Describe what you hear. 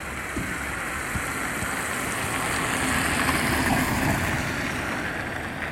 Sound effects > Vehicles
car sunny 05
car, engine, vehicle